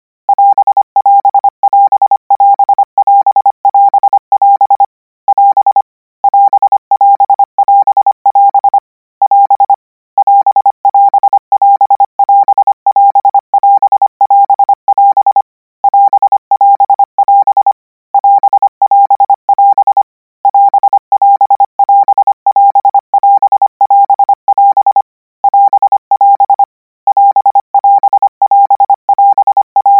Sound effects > Electronic / Design
Koch 54 & - 200 N 25WPM 800Hz 90%
Practice hear symbol '&' use Koch method (practice each letter, symbol, letter separate than combine), 200 word random length, 25 word/minute, 800 Hz, 90% volume.
code, codigo, morse, radio, symbols